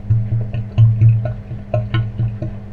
Sound effects > Objects / House appliances
Loopable washing machine gurgle
This clip of a gurgling drainpipe has a beat that could be looped and used in music. Admittedly a weird piece of music.